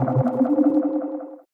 Sound effects > Electronic / Design
Digital UI SFX created using Phaseplant and Portal.
alert, digital, interface, message, confirmation, selection